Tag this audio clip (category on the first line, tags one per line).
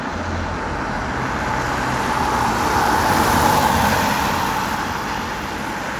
Soundscapes > Urban
Car
Drive-by
field-recording